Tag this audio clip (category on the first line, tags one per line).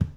Sound effects > Objects / House appliances
bucket
carry
clang
clatter
cleaning
container
debris
drop
fill
foley
garden
handle
hollow
household
kitchen
knock
lid
liquid
metal
object
pail
plastic
pour
scoop
shake
slam
spill
tip
tool
water